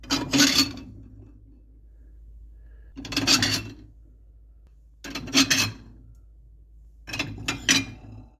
Sound effects > Objects / House appliances
CERMHndl-Samsung Galaxy Smartphone, CU Plate, Ceramic, Pull Out of Stack, X4 Nicholas Judy TDC
Pulling a ceramic plate out of a ceramic plate stack. Four times.
foley, out